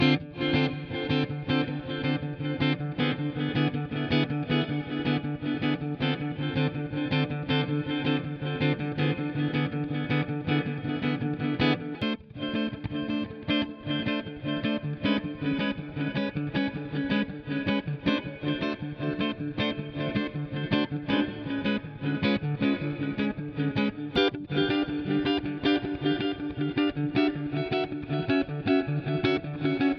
Music > Solo instrument
Guitar sequence with D pedal 160bpm
This sequence was made with electric guitar using fingerstyle.
electric, guitar, progression